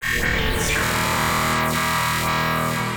Sound effects > Electronic / Design
Phaser Glimtttch
From a collection of robotic alien glitch fx, sounds like animals insects and bugs from another planet. Some droning landscape glitch effects made with Fl studio, Wavewarper 2, Infiltrator, Shaperbox, Fabfilter, Izotope, processed via Reaper
Abstract, FX, Alien, Noise, Creatures, Robotic, Droid, Spacey, Digital, Drone, Trippy, Creature, Trippin, Experimental, Analog, Glitch, Automata, Mechanical, Synthesis, Neurosis, Otherworldly, Buzz